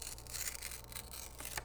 Sound effects > Objects / House appliances
PAPRRip-Blue Snowball Microphone Paper, Slow Nicholas Judy TDC
A slow paper rip.
foley, slow, rip, Blue-brand, paper, Blue-Snowball